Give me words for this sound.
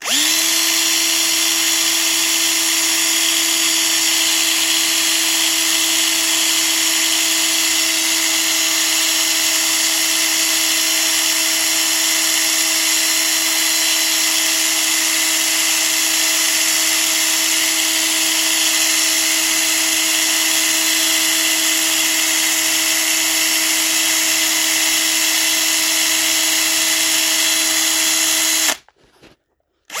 Sound effects > Objects / House appliances
TOOLPowr Power Screwdriver Nicholas Judy TDC
A power screwdriver.
screwdriver, power-screwdriver, power